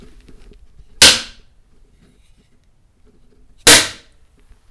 Sound effects > Objects / House appliances
Ruler hit2
Wooden ruler hitting wooden chair.
hit, ruler, smack, wood, wooden